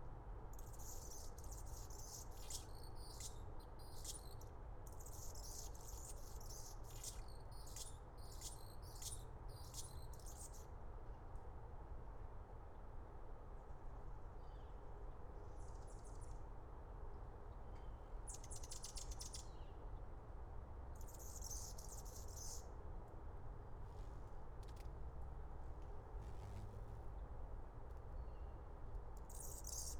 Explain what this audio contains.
Soundscapes > Nature
An Anna's hummingbird chirps a song from her favorite tree. Recorded onto a Zoom F3 via a stereo pair of omni mics clipped to branches on either side of her.